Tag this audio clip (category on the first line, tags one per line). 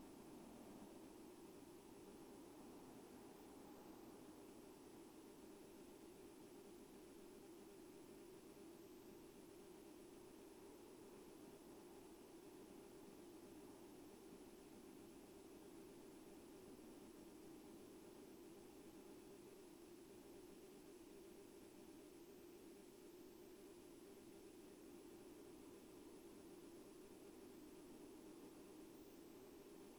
Soundscapes > Nature
weather-data
raspberry-pi
alice-holt-forest
natural-soundscape
Dendrophone
artistic-intervention
phenological-recording
soundscape
nature
data-to-sound
sound-installation
field-recording
modified-soundscape